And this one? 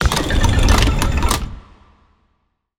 Sound effects > Other mechanisms, engines, machines
Complex Mechanism Long
Sound of moving/aligning a crane/mechanical arm created for a video game. The crane action sound got changed to an UI sound so I can share this one free. Created from organic recordings of gears, mechanical gizmos and industrial sounds.